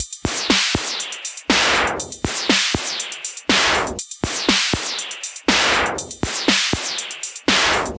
Music > Solo percussion
120 606Noise Loop 09
Sounds made using a Modified TR 606 Drum Machine
Drum, Bass